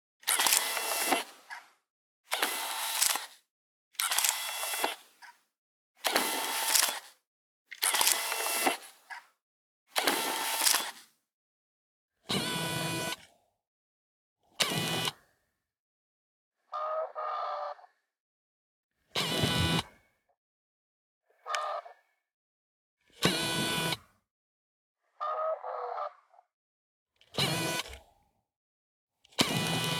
Sound effects > Objects / House appliances
Camera - Modern Compact (Lens, AF and Shutter)
A modern compact camera turning on and off, autofocusing, zooming in and out and taking a photo. Recorded with a Zoom H2n, using only the mid microphone (single cardioid condenser)